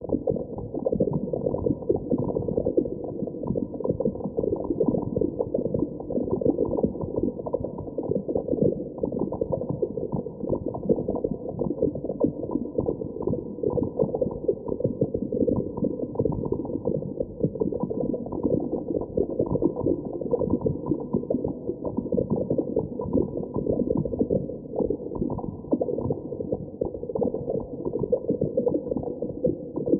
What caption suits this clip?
Soundscapes > Nature
Human Drops Water 1
Hi ! That's not recording sound :) I synth them with phasephant!
Droped, bubble, Drown